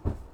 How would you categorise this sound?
Sound effects > Objects / House appliances